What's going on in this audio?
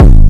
Instrument samples > Percussion
BrazilFunk Kick 34
Used a attack kick from Flstudio sample pack. I put it in to FL studio sampler to tweak pitch, pogo and boost randomly. Processed with ZL EQ and Waveshaper only. Yup, a stupid sample.
Distorted, Subsive, Kick, BrazilFunk, Sub